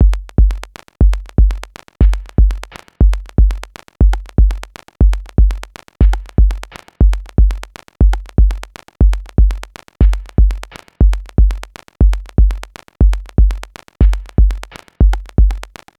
Music > Solo percussion
Organic Drum Loop 120bpm #002
This is a drum loop created with my beloved Digitakt 2. I like its organic simple sound.
loop, percussion, drum, percussion-loop, drum-loop, 120-bpm, 120bpm, drums, Thermionic, beat, neat, rhythm, organic